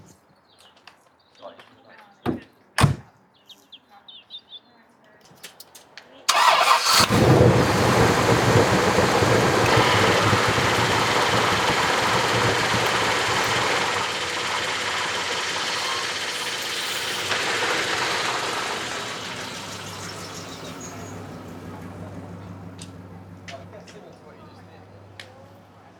Sound effects > Vehicles
1960s Bentley Engine Start Up
the sound of a door closing and engine stating on a 1960s Bentley
automobile, vehicle, engine, drive, car, ignition, start